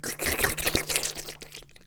Sound effects > Experimental
Creature Monster Alien Vocal FX (part 2)-020
Alien, bite, Creature, demon, devil, dripping, fx, gross, grotesque, growl, howl, Monster, mouth, otherworldly, Sfx, snarl, weird, zombie